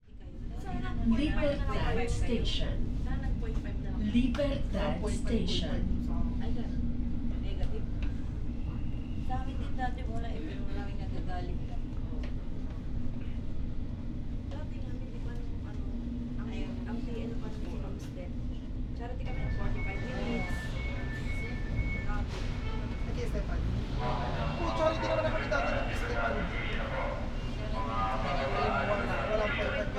Soundscapes > Urban
250807 102101 PH Travelling in MRT through Manila
Traveling in lRT (light rail transit) through Manila (binaural, please use headset for 3D effects). I made this binaural recording in a new model of train travelling on LRT (light rail transit) through Manila (Philippines), from Libertad station to Monumento station. One can hear passengers chatting, the doors of the train opening and closing, the buzzer when the doors close, voices announcements, and more. At the end of the file, I exit to the train station. Recorded in August 2025 with a Zoom H5studio and Ohrwurm 3D binaural microphones. Fade in/out and high pass filter at 60Hz -6dB/oct applied in Audacity. (If you want to use this sound as a mono audio file, you may have to delete one channel to avoid phase issues).
ambience, announcements, atmosphere, binaural, buzzer, children, doors, field-recording, horn, kids, LRT, Manila, men, noise, noisy, passengers, people, Philippines, platform, police-siren, public-transportation, soundscape, station, train, voices, women